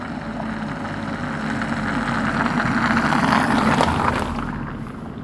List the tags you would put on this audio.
Sound effects > Vehicles
wet-road moderate-speed passing-by asphalt-road car

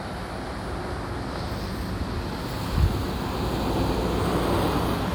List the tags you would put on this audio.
Sound effects > Vehicles
engine vehicle bus

